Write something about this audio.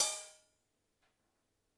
Music > Solo percussion
Recording ok a crash cymbal with all variations
cymbals, drums, crash